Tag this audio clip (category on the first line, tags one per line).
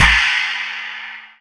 Instrument samples > Percussion
Zildjian
Paiste
metal
cymbals
brass
drum
china
Meinl
percussion
bronze
crash
metallic
Zultan
cymbal
Sabian
drums